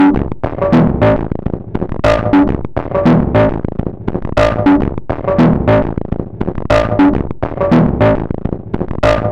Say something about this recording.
Instrument samples > Percussion
This 206bpm Drum Loop is good for composing Industrial/Electronic/Ambient songs or using as soundtrack to a sci-fi/suspense/horror indie game or short film.
Packs, Samples, Underground